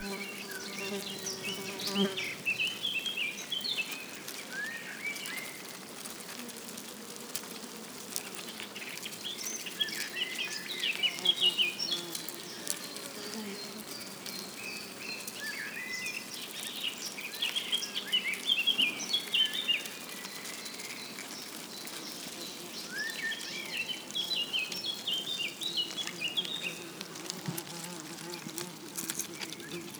Soundscapes > Nature
Ant Hill recorded with a Røde NTG5.